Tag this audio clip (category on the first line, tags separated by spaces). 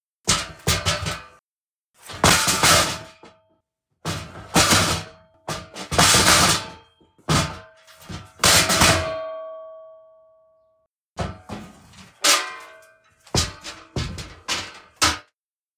Sound effects > Other
crash catastrophe muddle tragic wedge cargo clutter mayhem mess fall roll throw loads attic devastation percussive disaster stock havoc jumble pull shipwreck wreakage shatter disorder metal flooring chaos bazaar tragedy